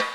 Music > Solo percussion
Snare Processed - Oneshot 206 - 14 by 6.5 inch Brass Ludwig
acoustic, drum, percussion, realdrums, reverb, roll, snares